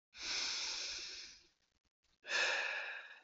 Sound effects > Human sounds and actions
In & Out Breath
A female breath in and out - recorded with Intel®Smart Sound Technology edited with Adobe Audition - no background noise. Good clean sound, can be looped for extended breathing